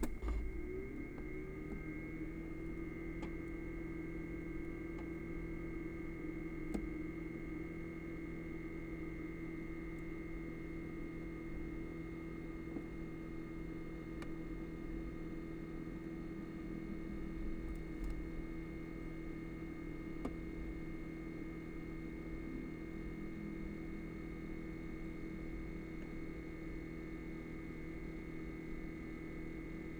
Sound effects > Objects / House appliances
MACHAppl-Blue Snowball Microphone Frigidaire Mini Fridge Or Cooler, Turn On, Run, Off Nicholas Judy TDC
A Frigidaire mini fridge or cooler turning on, running and turning off.
Blue-brand, cooler, run, frigidaire, Blue-Snowball, mini-fridge, turn-on, turn-off